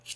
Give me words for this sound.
Sound effects > Other
LIGHTER.FLICK.7
zippo, Flicks, Lighter